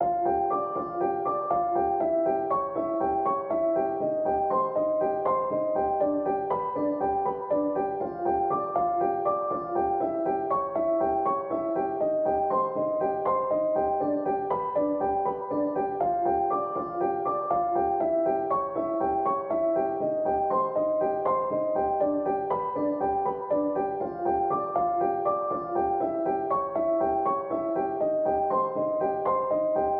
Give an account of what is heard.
Music > Solo instrument
Piano loops 201 octave short loop 120 bpm
120, 120bpm, free, loop, music, piano, pianomusic, reverb, samples, simple, simplesamples